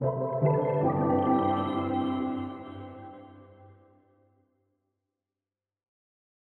Sound effects > Electronic / Design
Short SFX that sounds like a computer or application starting up. Remind a bootup of a system. Soft ang bright vibe, a little bit retro. Can be useful in a video game.